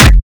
Instrument samples > Percussion
BrazilFunk Kick 15-Processed
Kick, BrazilFunk, Distorted, Brazilian, BrazilianFunk